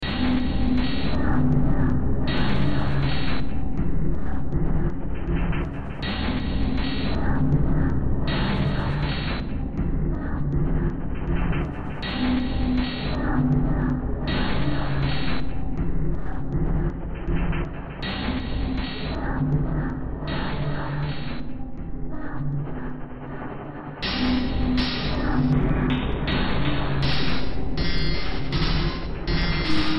Music > Multiple instruments
Demo Track #2937 (Industraumatic)
Soundtrack, Noise, Industrial, Horror, Games, Cyberpunk, Ambient, Underground